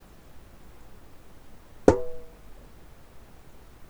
Instrument samples > Percussion
Tom imitation on a pot.
Isolated, Percussion